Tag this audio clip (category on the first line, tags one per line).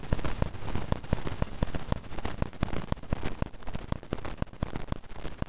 Sound effects > Electronic / Design
static
static-beat
industrial-noise
sci-fi
thumpy